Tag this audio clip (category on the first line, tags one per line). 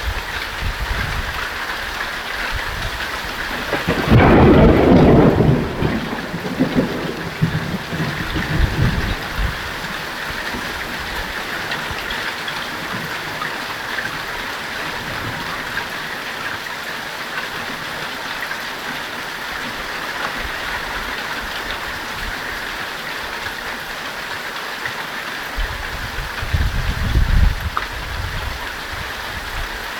Soundscapes > Nature
lightning
weather
thunderstorm
field-recording
nature
storm
thunder-storm
thunder
rain